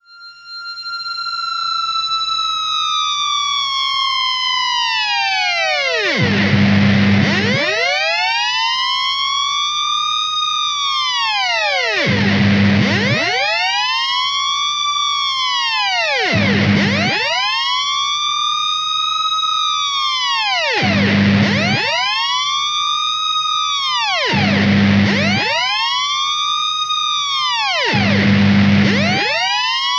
Instrument samples > Synths / Electronic
Theremin played through guitar fx